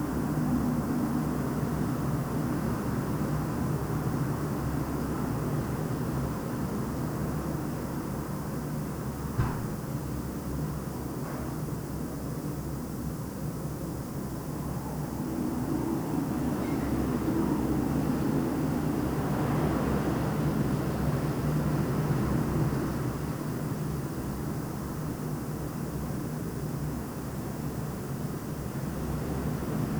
Soundscapes > Urban
Volgograd.Wind13.12.2025
Strong wind recorded inside the yard with Zoom H5Studio
ambience, field-recording, wind